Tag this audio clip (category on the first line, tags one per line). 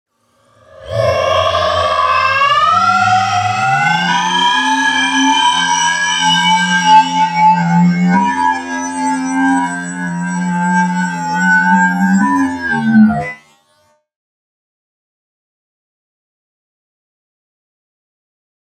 Speech > Processed / Synthetic
reverb
atmosphere
sounddesign
growl
fx
animal
vocal
abstract
glitchy
dark
processed
sound-design
strange
pitch
monster
effect
spooky
otherworldly
wtf
shout
sfx
howl
alien
weird
vox
vocals
glitch